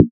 Instrument samples > Synths / Electronic
FATPLUCK 8 Bb
additive-synthesis, bass, fm-synthesis